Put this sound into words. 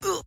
Sound effects > Other
This Sound Is My Voice And Also This Sound Was Created On: "November 8, 2022"